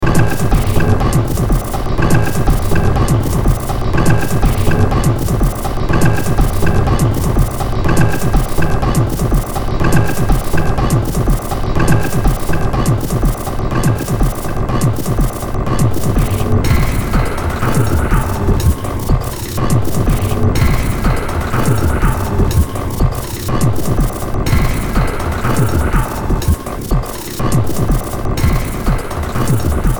Music > Multiple instruments
Demo Track #3679 (Industraumatic)
Ambient, Cyberpunk, Games, Horror, Industrial, Noise, Sci-fi, Soundtrack, Underground